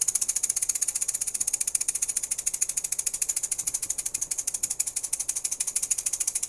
Music > Solo percussion
MUSCShake-Blue Snowball Microphone, MCU Egg Shaker, Bird Wings Flapping, Simulated Nicholas Judy TDC
An egg shaker imitating bird wings flapping.
flap, Blue-Snowball